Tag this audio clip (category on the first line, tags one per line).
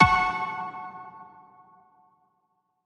Sound effects > Other
data
android